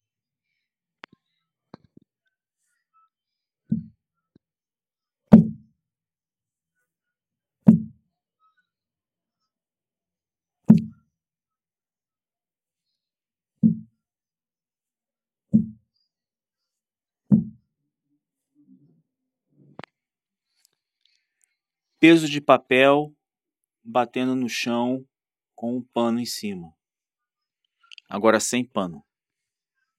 Sound effects > Objects / House appliances
Dumbbells hitting the floor - Halteres batendo no chão
hitting, no, floor, Halteres, batendo, Dumbbells, chao